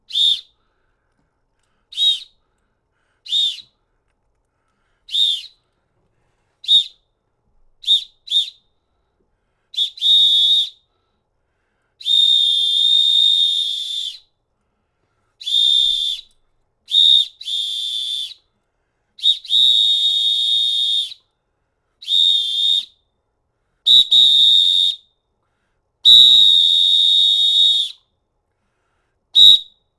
Sound effects > Objects / House appliances
A fox 40 whistle blowing.